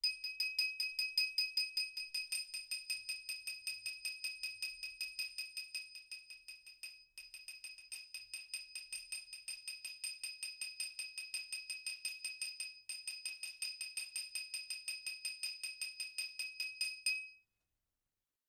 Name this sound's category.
Sound effects > Other